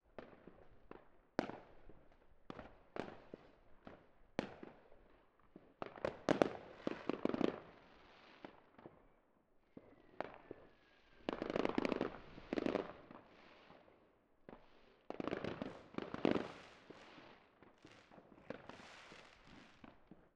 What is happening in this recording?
Sound effects > Natural elements and explosions
Stereo field recording of distant and near fireworks at the beginning of a New Year’s Eve celebration. Individual explosions with natural spacing, recorded outdoors with wide stereo image. Suitable for film, game ambience, documentaries and sound design. Recorded using a stereo A/B setup with a matched pair of RØDE NT5 microphones fitted with NT45-O omni capsules, connected to an RME Babyface interface. Raw field recording with no post-processing (no EQ, compression or limiting applied).